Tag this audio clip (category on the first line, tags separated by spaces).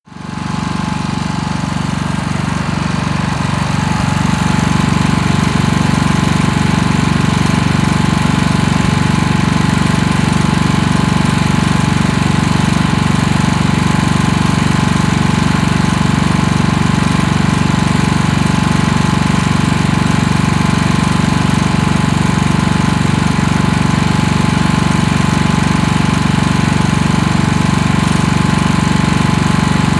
Sound effects > Other mechanisms, engines, machines
diesel generator machine